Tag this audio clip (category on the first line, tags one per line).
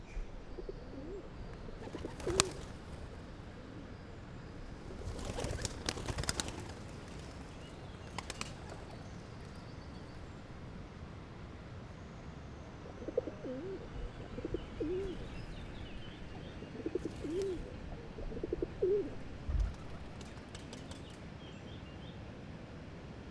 Sound effects > Animals
Outdoor
Shotgun-mic
June
Albi
Early-morning
France
2025
Sunday
City
MKE600
Morning
81000
Occitanie
Hypercardioid
Tarn